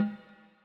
Music > Solo percussion
Snare Processed - Oneshot 227 - 14 by 6.5 inch Brass Ludwig
rimshot, drums, acoustic, perc, processed, reverb, realdrum, sfx, snareroll, drumkit, hits, snares, snaredrum, percussion, ludwig, rimshots, drum, fx, realdrums, brass, beat, hit, snare, oneshot, roll, rim, flam, crack, kit